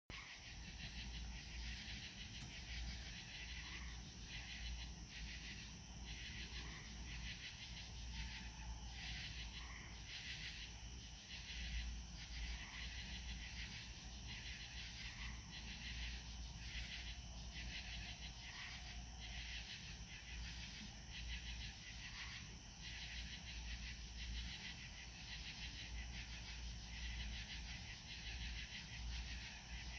Sound effects > Animals
Crickets L Hammer
Loud crickets in Montauk, Long Island, during a hot summer night
ambience, night